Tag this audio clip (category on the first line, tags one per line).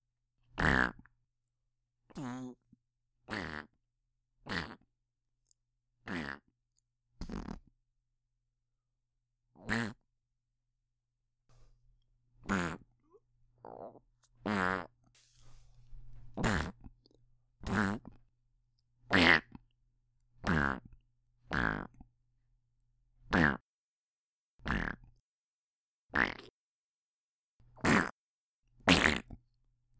Sound effects > Other
Bad
Cartoon
Failure
Fart
flatulence
Human
lame
Loser
underwhelming
Wah
Wahwah